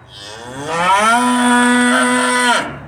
Sound effects > Animals
Bovines - Cattle; Loud Bellow from Hereford Bull
Recorded with an LG Stylus 2022. This loud Hereford bull vocalizes.
bull, cow, farm, hereford, moo